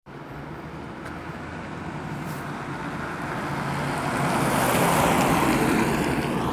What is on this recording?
Soundscapes > Urban
What: Car passing by sound Where: in Hervanta, Tampere on a cloudy day Recording device: samsung s24 ultra Purpose: School project